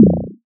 Instrument samples > Synths / Electronic
BWOW 2 Bb
additive-synthesis, bass, fm-synthesis